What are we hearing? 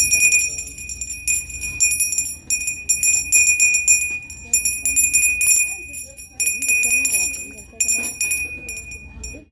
Sound effects > Objects / House appliances
BELLHand-Samsung Galaxy Smartphone, CU Glass, Ringing Nicholas Judy TDC
A glass bell ringing. Recorded at Goodwill.